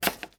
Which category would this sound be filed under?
Sound effects > Other